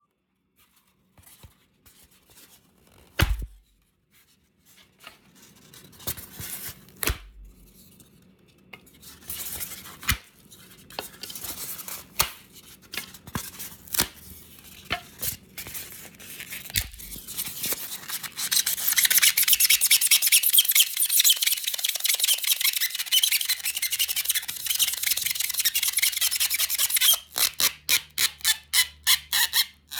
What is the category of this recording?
Sound effects > Other